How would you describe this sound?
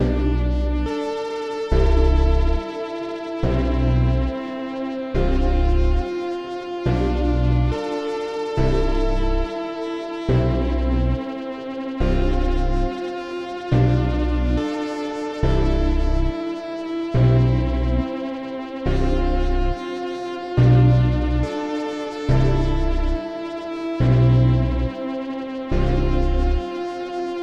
Music > Multiple instruments
Weird Pad/bass loop 140bpm
Made in FL studio with Analog lab V, Toxic Biohazard and Sytrus. Leave a rating if you like it Use for anything :)